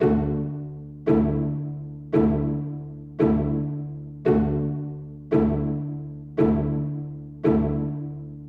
Instrument samples > String
pizzacatto stem from my track I've Made a Mistake , 113 BPM made using flex